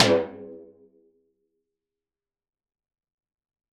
Soundscapes > Other
I&R Tunnel cimetiere Pratgraussal Opposite sides Mic N pop
tunnel, impulse-response, convolution-reverb, Rode, NT5-o, Impulse-and-response, impulse, NT5o, FR-AV2, pop, Balloon, IR, convolution, Tascam